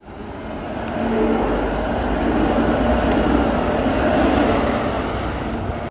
Soundscapes > Urban
Tram passing Recording 4
Rail, Tram